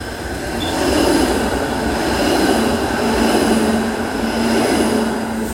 Sound effects > Vehicles
tram rain 12
rain, motor